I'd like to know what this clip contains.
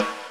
Music > Solo percussion
Snare Processed - Oneshot 185 - 14 by 6.5 inch Brass Ludwig
rimshots, snaredrum